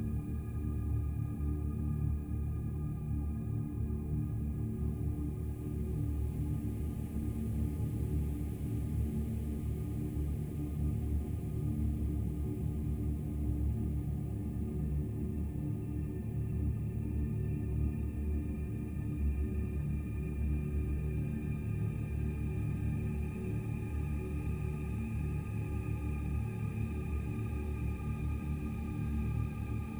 Soundscapes > Synthetic / Artificial
Horror Atmosphere 11 Tiptoeing Loop
Horror Atmosphere Ambience - created by layering various field recordings and foley sounds and applying processing and effects. A readymade loop that can be extended to any length required, mixed with voiceover in mind.
Dark-Ambience, Dark-Atmosphere, Horror, Horror-Ambience, Horror-Atmosphere, Seamless-Loop, Tension, Thriller, Through-the-Darkness, Tiptoeing